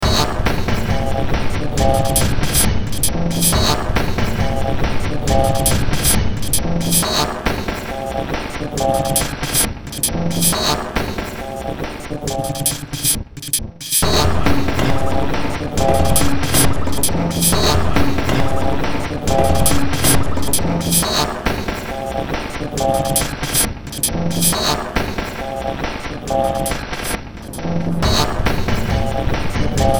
Music > Multiple instruments
Short Track #4000 (Industraumatic)
Horror, Underground, Noise, Games, Sci-fi, Cyberpunk, Soundtrack, Ambient, Industrial